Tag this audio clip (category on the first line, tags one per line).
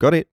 Speech > Solo speech
got-it
mid-20s
Adult
MKE600
Male
Shotgun-microphone
Voice-acting
Generic-lines
Single-mic-mono
Calm
july
2025
got
Sennheiser
MKE-600